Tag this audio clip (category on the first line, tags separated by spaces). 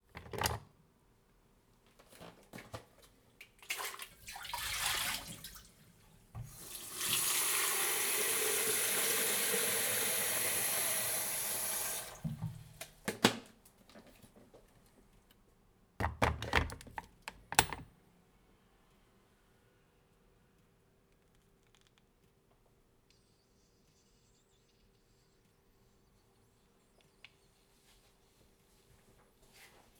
Sound effects > Objects / House appliances
electric-kettle kettle steam boiling boil kitchen water